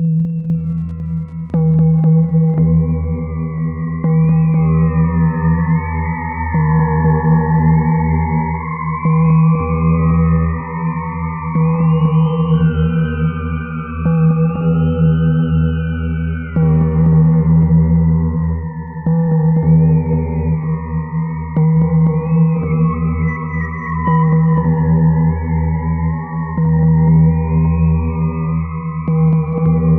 Soundscapes > Synthetic / Artificial
Spiders In My Spaceship
Yet another interesting, if somewhat unnerving, soundscape produced by the LSD synths. This one is definitely more suited to the horror side of sound design.